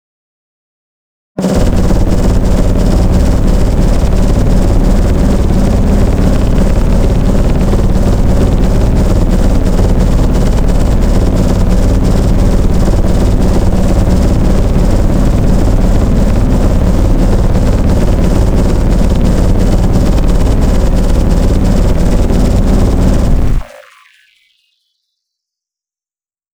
Music > Solo percussion
Simple Bass Drum and Snare Pattern with Weirdness Added 036
Experiments-on-Drum-Patterns, FX-Laden-Simple-Drum-Pattern, Noisy, Experimental-Production, Bass-Drum, Simple-Drum-Pattern, FX-Drum-Pattern, Experimental, Snare-Drum, Bass-and-Snare, Silly, Four-Over-Four-Pattern, Interesting-Results, Glitchy, FX-Drums, FX-Drum, Fun, Experiments-on-Drum-Beats, FX-Laden